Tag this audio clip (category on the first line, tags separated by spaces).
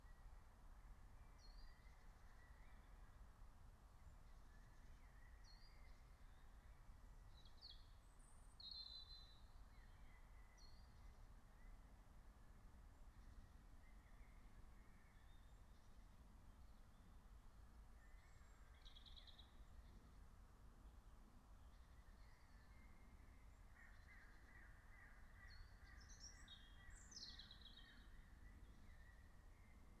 Soundscapes > Nature
field-recording phenological-recording meadow natural-soundscape raspberry-pi nature soundscape alice-holt-forest